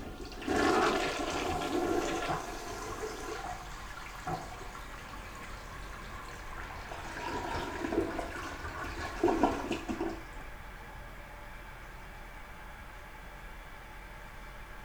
Sound effects > Objects / House appliances
Toilet Flush
bathroom, house, toilet, indoor, flushing, flush